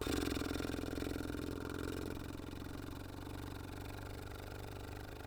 Sound effects > Animals
TOONAnml-Blue Snowball Microphone Cat, Purring, Human Imitation, Cartoon Nicholas Judy TDC
A cat purring. Human imitation.
human, cat, purr, cartoon, imitation, Blue-Snowball, Blue-brand